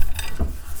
Sound effects > Other mechanisms, engines, machines
metal shop foley -006
a collection of foley and perc oneshots and sfx recorded in my workshop
bam, bang, boom, bop, crackle, foley, fx, knock, little, metal, oneshot, perc, percussion, pop, rustle, sfx, shop, sound, strike, thud, tink, tools, wood